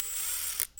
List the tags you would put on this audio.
Sound effects > Objects / House appliances
clunk
industrial
foley
object
foundobject
fieldrecording
bonk
drill
natural
glass
oneshot
mechanical
percussion
fx
hit
stab
sfx
metal
perc

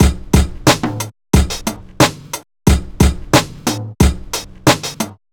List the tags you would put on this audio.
Music > Other
130-bpm
beat
dj
drumbeat
drumloop
drums
groovy
hiphop
loops
music
rubbish

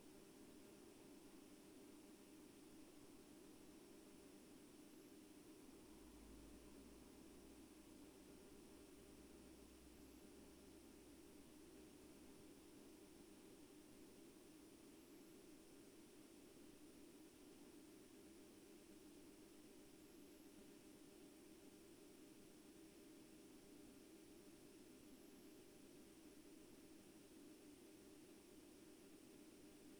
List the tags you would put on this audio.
Soundscapes > Nature
nature
natural-soundscape
sound-installation
soundscape
weather-data
alice-holt-forest
field-recording
data-to-sound
modified-soundscape

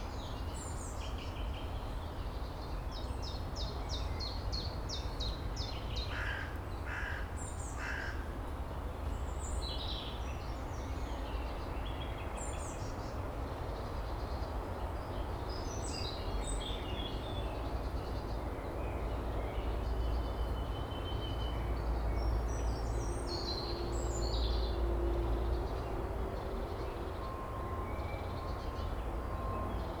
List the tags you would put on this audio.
Soundscapes > Nature
surround
H2n
albi
Zoom
ambience
France
Early-afternoon
4channel
field-recording
05
urbain-park
Occitanie
81000
urbain-nature
Afternoon
tarn
May
2025

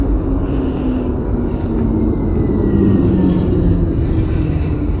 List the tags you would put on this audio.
Sound effects > Vehicles
transportation
vehicle
tramway

